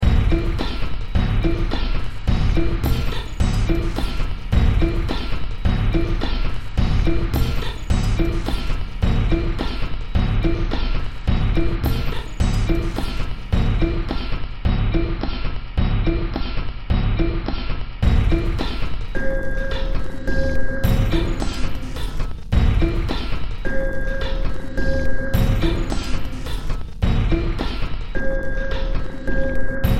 Music > Multiple instruments
Demo Track #3070 (Industraumatic)
Ambient, Cyberpunk, Games, Horror, Industrial, Noise, Sci-fi, Soundtrack, Underground